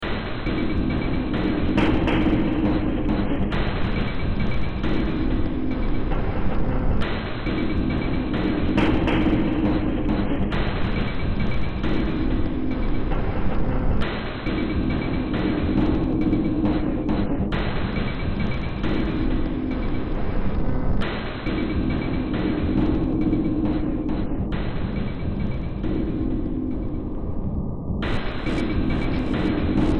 Music > Multiple instruments
Demo Track #3182 (Industraumatic)
Cyberpunk, Games, Horror, Industrial, Noise, Sci-fi, Underground